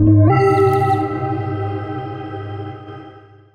Instrument samples > Synths / Electronic
CVLT BASS 45
bass, bassdrop, clear, drops, lfo, low, lowend, stabs, sub, subbass, subs, subwoofer, synth, synthbass, wavetable, wobble